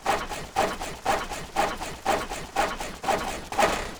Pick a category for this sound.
Sound effects > Electronic / Design